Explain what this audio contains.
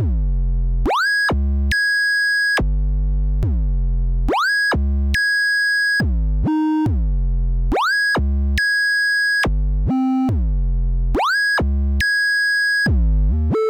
Music > Solo instrument
Glide 808 Loop 1 140 bpm
Retoued the minimal kick 55 from flstudio original sample pack. A experiment of 808 groove.
808, Rnb, Bass, BrazilFunk, Phonk, Loop